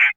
Sound effects > Experimental
Analog Bass, Sweeps, and FX-019
alien, analog, analogue, bass, basses, bassy, complex, dark, effect, electro, electronic, fx, korg, machine, mechanical, oneshot, pad, retro, robot, robotic, sample, sci-fi, scifi, sfx, snythesizer, sweep, synth, trippy, vintage, weird